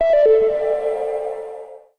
Sound effects > Electronic / Design
XD Download
A welcome lil ringtone/chime, made on a Korg Minilogue XD, processed in Pro Tools. A quick lil motif, with added spacey reverb.
synth, electronic, game, blip, computer, sfx, digital, beep, ringtone, effect, bleep, gui, chirp, ui, Minilogue-XD, processed, click, Korg